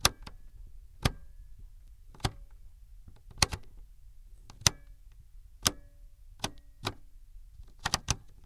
Sound effects > Vehicles
Ford 115 T350 - AC knob rotating
Tascam, A2WS, SM57, T350, FR-AV2, Vehicle, Single-mic-mono, Ford, Van, August, 2003, France, 2025, Mono, 2003-model, Old, Ford-Transit, 115